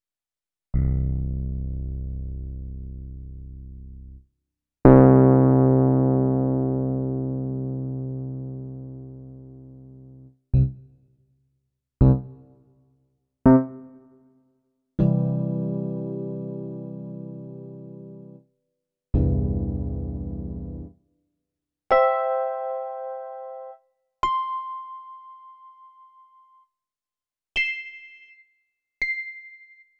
Instrument samples > Piano / Keyboard instruments
Yamaha E-Piano pads
Yamaha electric piano, misc synth pad sounds.
pads, Yamaha, epiano